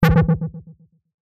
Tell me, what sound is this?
Sound effects > Electronic / Design
MULTIMEDIA HARSH ANALOG BLOOP
EXPERIMENTAL, HIT, CIRCUIT, CHIPPY, INNOVATIVE, COMPUTER, BEEP, BOOP, ELECTRONIC, OBSCURE, SYNTHETIC, DING, HARSH, SHARP, UNIQUE